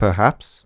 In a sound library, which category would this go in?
Speech > Solo speech